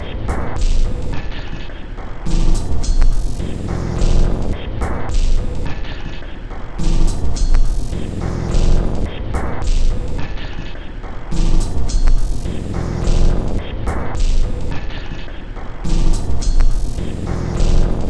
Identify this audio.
Soundscapes > Synthetic / Artificial
This 106bpm Ambient Loop is good for composing Industrial/Electronic/Ambient songs or using as soundtrack to a sci-fi/suspense/horror indie game or short film.
Alien, Ambient, Dark, Drum, Industrial, Loop, Loopable, Packs, Samples, Soundtrack, Underground, Weird